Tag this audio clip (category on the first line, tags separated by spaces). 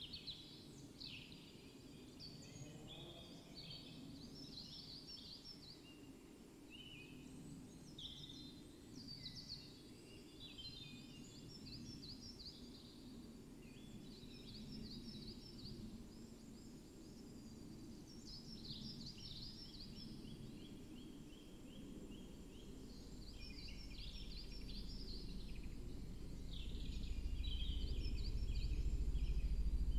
Soundscapes > Nature
Dendrophone field-recording modified-soundscape soundscape alice-holt-forest raspberry-pi artistic-intervention weather-data phenological-recording natural-soundscape sound-installation data-to-sound nature